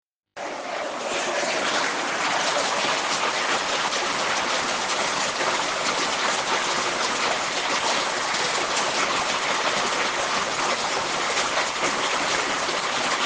Sound effects > Natural elements and explosions
#0:13 Raining outside.
Field, Free, Movie, Recording, Film, Public